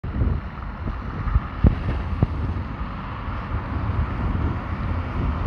Soundscapes > Urban
A bus passing the recorder in a roundabout. The sound of the bus engine can be heard in the recording Recorded on a Samsung Galaxy A54 5G. The recording was made during a windy and rainy afternoon in Tampere.
bus, driving, engine